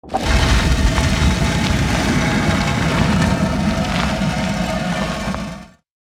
Sound effects > Natural elements and explosions
large stone door/passage opening sound effect. Based on layered DR-07x recordings of me dragging cinder blocks together with added debris and motion textures.